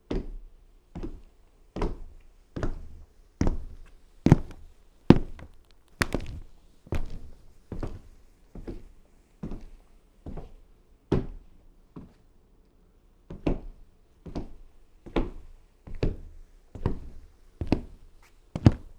Sound effects > Human sounds and actions
Work boots on wood floor
Walking away from and towards the mic wearing heavy steel-toes workboots on a wooden floor. Recorded with a Zoom F3 Field Recorder.
walking, floor, workboots, boots, wood, steps, footsteps